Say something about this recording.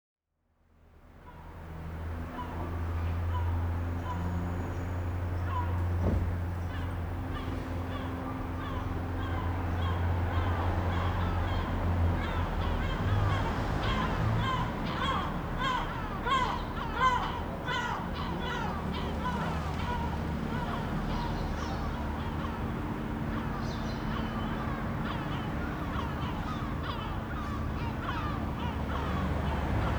Soundscapes > Urban
MS Seagulls City
A Mid-Side (M/S) recording from the city of Chalkis in Greece, featuring seagulls and some traffic noise. Microphones used: Sennheiser MKH-416-P48 (Side) Behringer B-2 PRO (Mid)